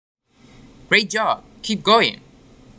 Sound effects > Experimental
For personal project
dont, some, thing